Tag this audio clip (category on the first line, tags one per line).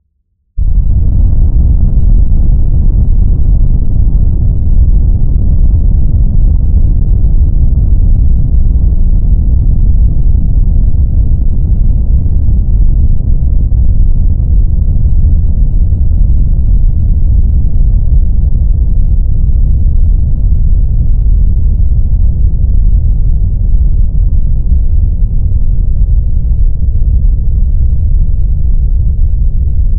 Sound effects > Other
Bass
Movie
Rumble
Cinematic
Atmosphere
Free
Earthquake
Film
Deep
Low
Drone
Dark